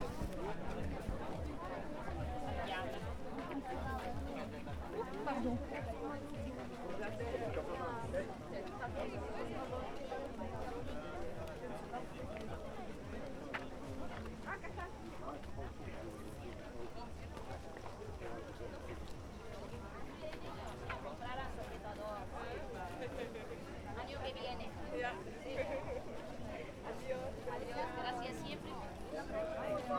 Soundscapes > Urban
Calpe Market 6
people, street, field-recording, Tascam-DR-40X, spanish, market, voices, chatter